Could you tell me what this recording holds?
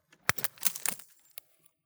Sound effects > Human sounds and actions
Mirror Smash Sound Effect
Sound Recording of a compact mirror being thrown at a tree
compact, mirror, smashing